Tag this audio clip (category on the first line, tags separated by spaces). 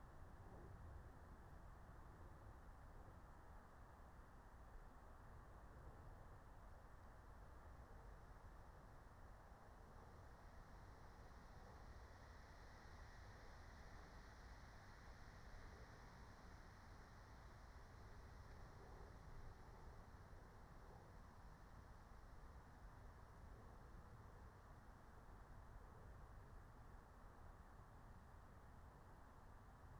Soundscapes > Nature
alice-holt-forest soundscape natural-soundscape raspberry-pi field-recording phenological-recording meadow nature